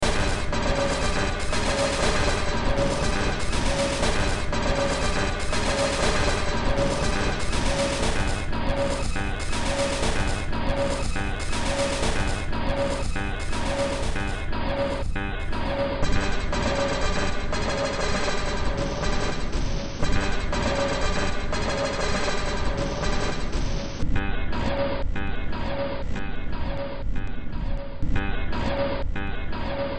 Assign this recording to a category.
Music > Multiple instruments